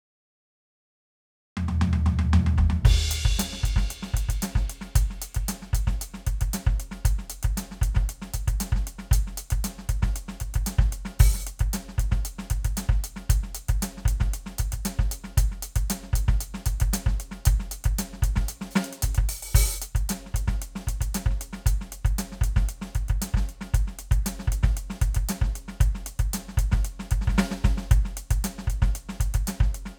Music > Solo instrument
Drum track 115BPM of the acoustic kit on edrum lol
115BPM, Acoustic, Track